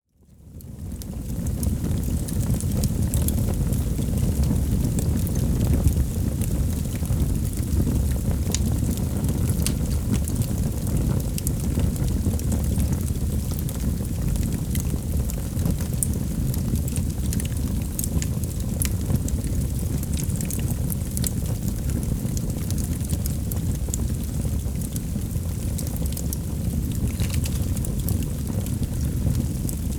Soundscapes > Nature
Burning Bag of Pinecones
A recording of a bag of pinecones burning atop a wood fire in a brick fireplace. Equipment: Pair Omnidirectional Clippy EM272 Microphones.
Wind, Field-Recording, fire, Atmosphere, Leaves, Park, Ambient, pinecones